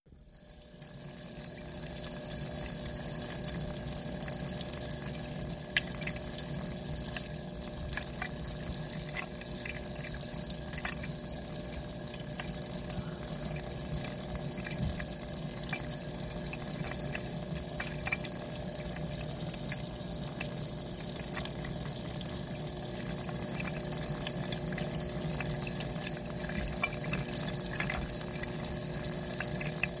Sound effects > Natural elements and explosions
WATRMisc-Samsung Galaxy Smartphone, CU Water Dispenser, Reptile, Automatic Nicholas Judy TDC
An automatic reptile water dispenser.
Phone-recording, automatic, dispenser